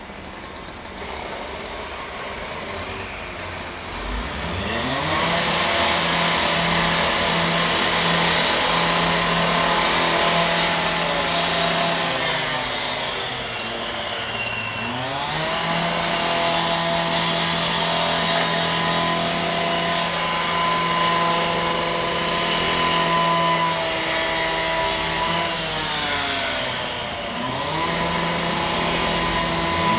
Soundscapes > Urban
A circular saw on a building site, recorded from a second story window via Zoom H2n. The saw runs in short bursts, cutting up concrete, a small cement mixer runs in the background. The cutting stops occasionally, before continuing.